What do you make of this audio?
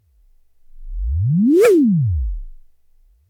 Sound effects > Electronic / Design
SCI FI WHOOSH DRY
ui, flyby, air, effect, gaussian, company, pass-by, swoosh, jet, sound, whoosh, transision